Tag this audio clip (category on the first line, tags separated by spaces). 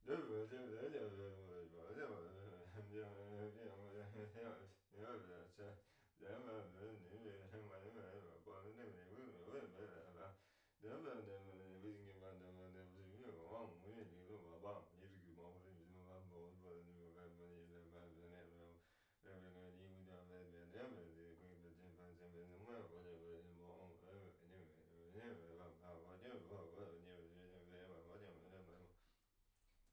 Speech > Other
solo-crowd NT5 FR-AV2 indoor talking Tascam mumbo mumbling Rode Mumble XY unintelligible